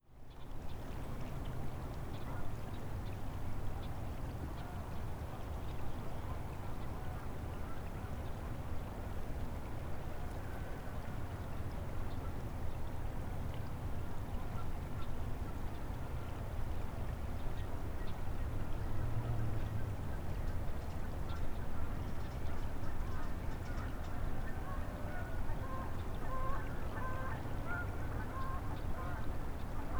Soundscapes > Nature
morning geese - black river - 01.18.26
Canada geese fly overhead near the black river in the morning. Littlerock, Washington Recorded with Zoom H6 XY mic